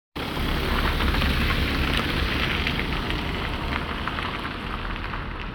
Sound effects > Vehicles
vw caddy
Sound of a combustion engine car passing, captured in a parking lot in Hervanta in December. Captured with the built-in microphone of the OnePlus Nord 4.